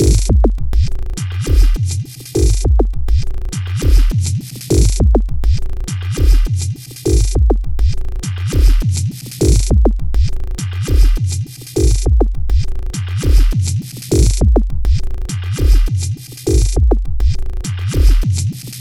Instrument samples > Percussion
This 102bpm Drum Loop is good for composing Industrial/Electronic/Ambient songs or using as soundtrack to a sci-fi/suspense/horror indie game or short film.
Weird, Samples, Loop, Loopable, Industrial, Alien, Drum, Packs, Underground, Ambient, Dark, Soundtrack